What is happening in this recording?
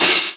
Instrument samples > Percussion
crash Sabian HHX low-pitched short
A low-pitched Sabian HHX Evolution Ozone Crash (initially 20 inches but now it's weird), EQed and mixed with other crashes at a lower volume.
Paiste, Meinl, clang, crash, boom, China, clash, Chinese, crunch, Zildjian, metal, Istanbul, flangcrash, smash, bang, hi-hat, Stagg, Sabian, shimmer, sizzle, sinocrash, cymbal, UFIP, metallic, ride, crack, Soultone, Sinocymbal